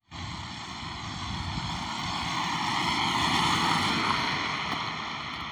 Sound effects > Vehicles
car passing 3
car, drive, vehicle